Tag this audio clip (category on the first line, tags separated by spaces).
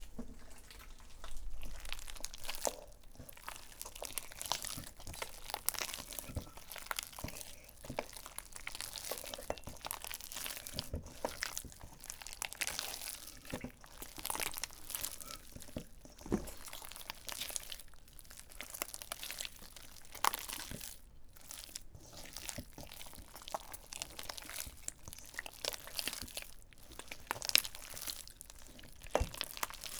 Sound effects > Objects / House appliances
flesh goop goopy gore gross slime sloppy slosh squish water wet